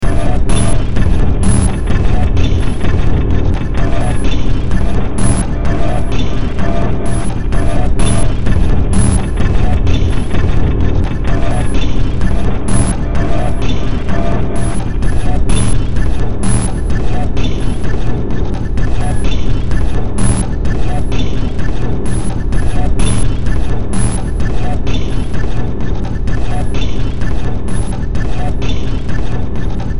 Music > Multiple instruments
Demo Track #3464 (Industraumatic)
Noise, Horror, Games, Ambient, Industrial, Sci-fi, Underground, Cyberpunk, Soundtrack